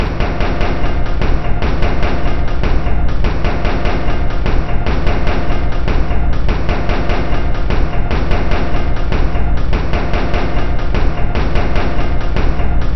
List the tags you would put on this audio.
Instrument samples > Percussion
Loopable Alien Samples Soundtrack Drum Industrial Underground Weird Ambient Loop Packs Dark

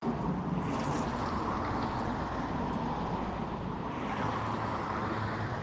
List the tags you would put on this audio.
Sound effects > Vehicles
cars driving

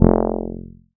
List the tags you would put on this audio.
Instrument samples > Synths / Electronic
bass additive-synthesis fm-synthesis

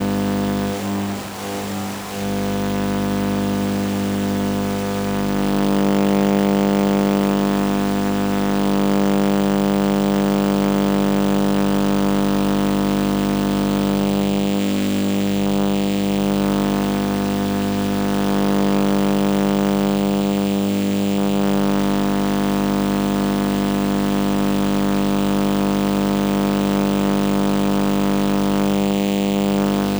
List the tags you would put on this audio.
Soundscapes > Other
drone electromagnetic buzz electrical hiss noise sound sounds hum SOMA

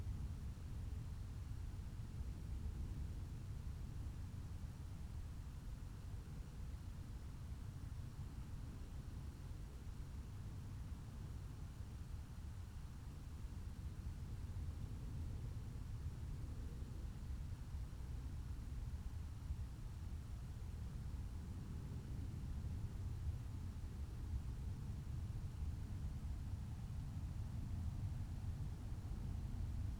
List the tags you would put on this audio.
Soundscapes > Nature
soundscape meadow alice-holt-forest raspberry-pi field-recording phenological-recording natural-soundscape nature

